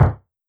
Sound effects > Human sounds and actions
Shoes on gravel, walking. Lo-fi. Foley emulation using wavetable synthesis.